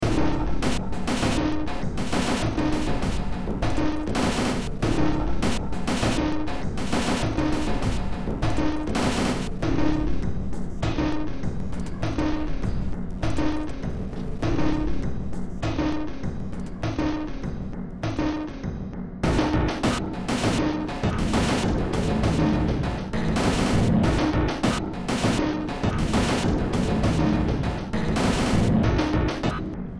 Music > Multiple instruments
Demo Track #3672 (Industraumatic)
Ambient, Cyberpunk, Games, Horror, Industrial, Noise, Sci-fi, Soundtrack